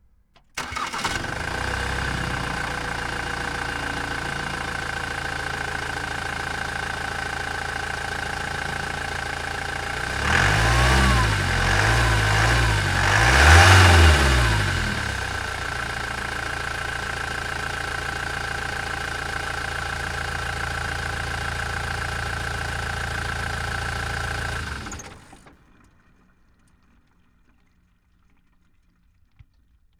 Sound effects > Vehicles
Ford 115 T350 - Engine from front under
115, Single-mic-mono, Ford-Transit, T350, August, Tascam, France, A2WS, SM57, Ford, Van, Old, 2003, FR-AV2, 2025, Vehicle, 2003-model, Mono